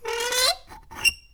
Sound effects > Other mechanisms, engines, machines
metal shop foley -103
bam, bang, boom, bop, crackle, foley, fx, knock, little, metal, oneshot, perc, percussion, pop, rustle, sfx, shop, sound, strike, thud, tink, tools, wood